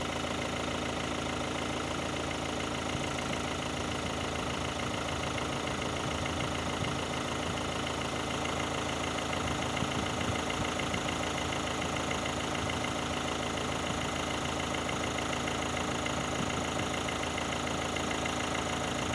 Sound effects > Vehicles
bluehdi idle2
2022 Peugeot 1.5 BlueHDI diesel engine idling (cold). Recorded near the right wheel arch. Recorded with my phone using Dolby On